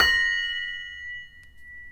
Sound effects > Other mechanisms, engines, machines
metal shop foley -073
strike, perc, oneshot, rustle, bang, metal, wood, tink, tools, fx, pop, bam, percussion, sound, sfx, thud, crackle, bop, foley, little, shop, knock, boom